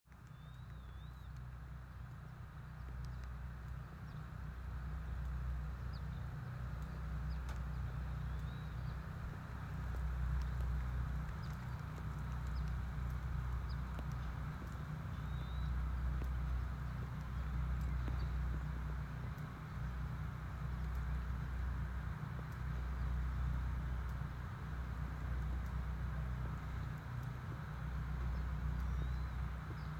Soundscapes > Other
Generators hamming during blackout